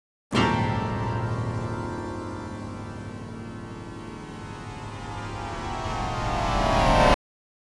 Sound effects > Electronic / Design
Stingers and Stabs 004
A sting / stab (or stinger) to use for an accent, transition, or FX. Good for horror, science fiction, etc. Hard hit that twists, fades, then ramps up to a crescendo and hard cut. I would love to hear how you put the sound to work.